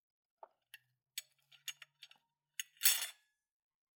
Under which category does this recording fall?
Sound effects > Objects / House appliances